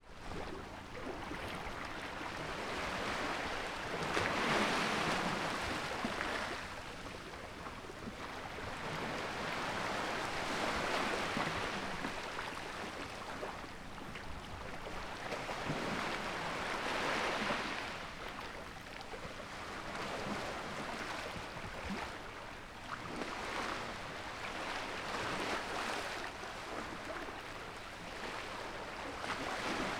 Soundscapes > Nature
Tingloy lagoon atmosphere. I made this recording in the morning, at a place called The Lagoon by the inhabitants, on the western coast of Tingloy, a small island in Batangas Province, in the Philippines. One can hear gentle waves and wavelets lapping the rocky coast, while some fishermen using small motorboats (outrigger canoes called Bangka) will approach at #5:30, and pass by in front of me a few seconds later. Recorded in August 2025 with a Zoom H5studio (built-in XY microphones). Fade in/out applied in Audacity.